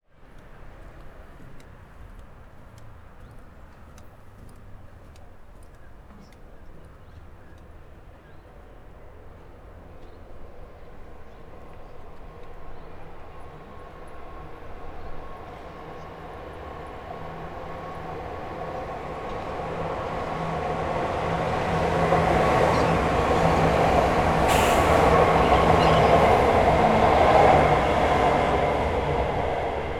Soundscapes > Urban
AMB SFX EXT URBAN PEDESTRIAN BRIDGE TRAIN CITY PETRALONA XY H5
Sound captured on a pedestrian bridge crossing a railway, an electric train is passing. We can also hear people walking and speaking as well as some cars from the street next to it.
electrictrain, train, field-recording, city, street